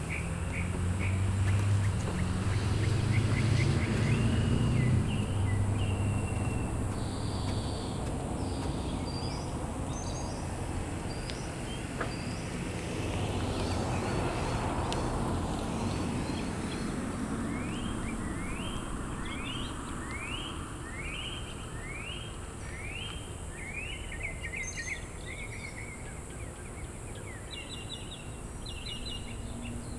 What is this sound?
Soundscapes > Urban
AMBSubn-Summer coastal island residential street, birdsong, traffic, wind, 1030AM QCF Gulf Shores Alabama Zoom F3 with Rode M5
Mid-morning residential street on coastal Alabama island. passing traffic, wind, birds, 10:30AM
field-recording, neighborhood, summer, traffic